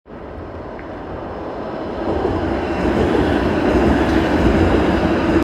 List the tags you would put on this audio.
Sound effects > Vehicles
city
public-transport
tram

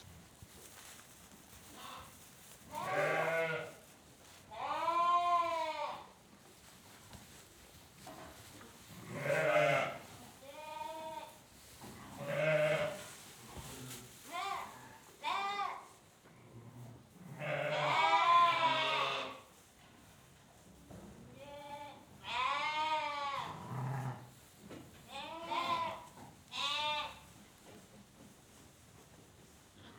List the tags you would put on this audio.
Soundscapes > Nature
farm
mouton
animal
brebis
ferme
sheep

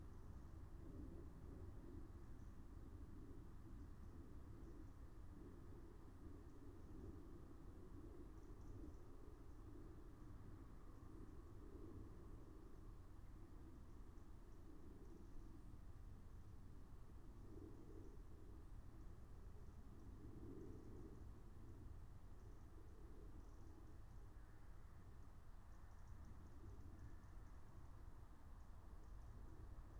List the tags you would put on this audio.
Soundscapes > Nature
raspberry-pi
field-recording
nature
meadow

